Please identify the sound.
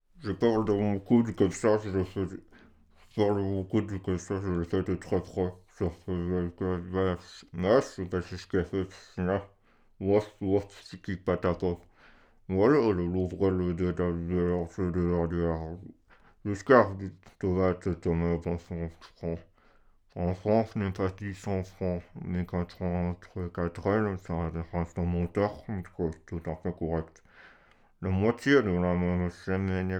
Speech > Other
Mumbo Jumbo 8 Upclose muffled Frenchlike
Mumble, indoor, Tascam, Rode, unintelligible, up-close, talking, XY, NT5, french-like, solo-crowd, FR-AV2, mumbo, mumbling